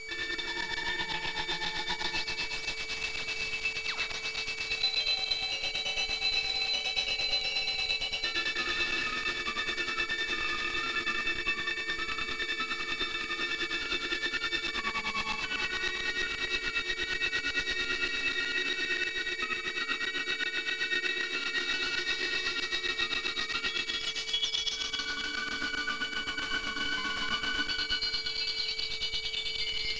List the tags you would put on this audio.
Sound effects > Electronic / Design
abstract
ambient
commons
creative
noise
noise-ambient

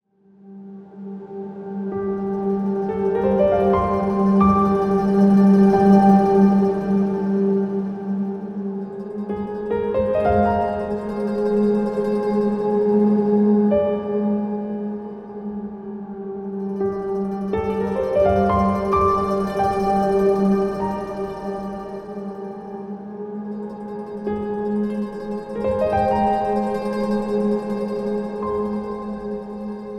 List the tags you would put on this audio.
Music > Multiple instruments
meditative-ambience
musical-nostalgia
peaceful-piano-chords
nostalgic-piano-arpeggios
piano-arpeggesio
peaceful-meditation
meditative-music
soothing-piano
nostalgic-piano-chords
meditative-jingle
reflective-piano
meditation
contemplative-music
cotemplative-piano-chords
nostalgic-vibes
gentle-piano-chords
meditation-music
nostalgic-piano
meditative-music-loop
nostlagic-music
deep-meditaion
contemplative-piano
soothing-piano-chords
peaceful-piano-arpeggios
gentle-piano-arpeggios
nostalgic-ambience
reflective-piano-chords
sacred-meditation

